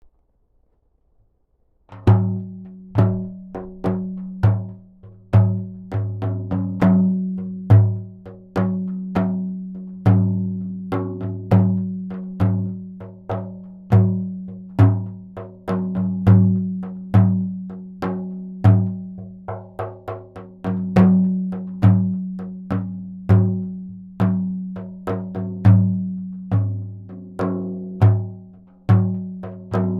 Music > Solo percussion
Solo-percussion of frame-drum by Schlagwerk recorded on my Pixel Pro 6